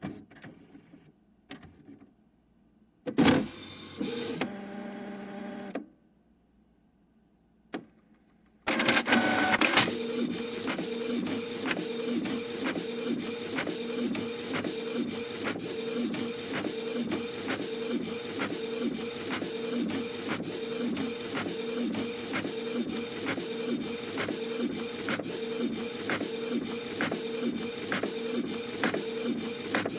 Sound effects > Objects / House appliances
Printing an 8 page Homework assignment on the Canon TS3720 printer. In all, 8 pieces of paper were printed. To get this sound, my printer expert friend placed two small microphones inside the printer facing away from each other. Please do not attempt this yourself. I had a technician assist me in getting these recordings. Thank you.